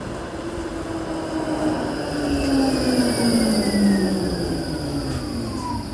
Sound effects > Vehicles
tram rain 07
A recording of a tram passing by on Insinöörinkatu 30 in the Hervanta area of Tampere. It was collected on November 7th in the afternoon using iPhone 11. There was light rain and the ground was slightly wet. The sound includes the whine of the electric motors and the rolling of wheels on the wet tracks.
tram, rain